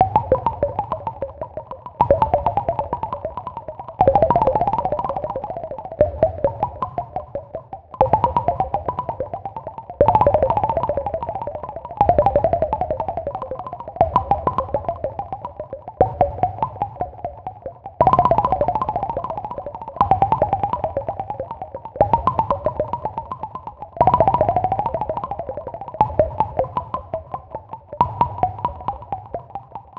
Soundscapes > Synthetic / Artificial
Blip Blop ambient made out of a drum sample